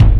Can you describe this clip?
Instrument samples > Percussion
taiko tom 1 short
This wavefile doesn't have a full/complete attack. A low-pitched merger of three (3) older toms of mine with a kick. I prefer the totally fake shorter version of this file because it's an extra; not a basic tom.
bass, cylindrical, drum, drums, floor-1, Japan, percussion, taiko, tom, tom-tom, unsnared, wadaiko